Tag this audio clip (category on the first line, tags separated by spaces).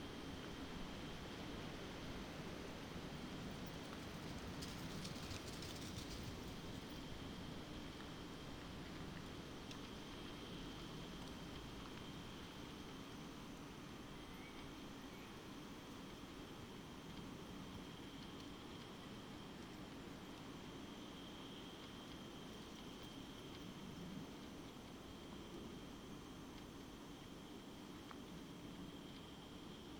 Soundscapes > Nature
field-recording raspberry-pi artistic-intervention phenological-recording weather-data data-to-sound soundscape